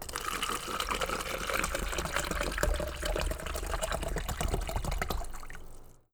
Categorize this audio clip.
Sound effects > Natural elements and explosions